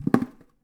Sound effects > Other mechanisms, engines, machines
metal shop foley -216
bam, bang, boom, bop, crackle, foley, fx, knock, little, metal, oneshot, perc, percussion, pop, rustle, sfx, shop, sound, strike, thud, tink, tools, wood